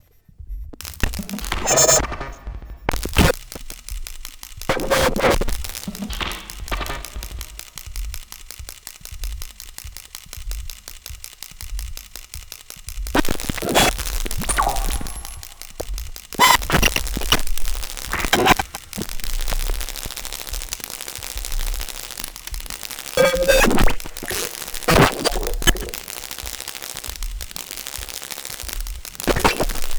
Sound effects > Electronic / Design
Dustmite Chorale 16
The 'Dustmites Chorale' pack from my 'Symbiotes' sampler is based on sounds in which the dominant feature is some form of surface noise, digital glitch, or tape hiss - so, taking those elements we try to remove from studio recordings as our starting point. Here are some very strange artifacts produced by a live pairing of "cracklebox"-style touchplate controllers with a malfunctioning digital buffer effect.